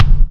Instrument samples > Percussion
kick 1 brief
bass, bass-drum, drum, fat-drum, fat-kick, forcekick, groovy, headsound, metal, Pearl, percussion, rhythm, rock